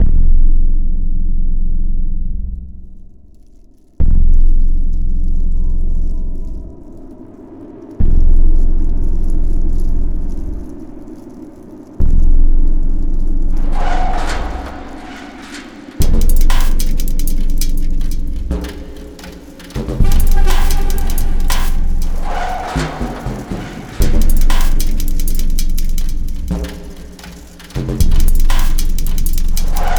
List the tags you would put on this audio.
Music > Multiple instruments
acusmatics manipulated objet-sonore synthesis

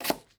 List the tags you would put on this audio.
Sound effects > Other
Chef Chief Chop Cook Cooking Home Kitchen Knife Quick Slice Vegetable

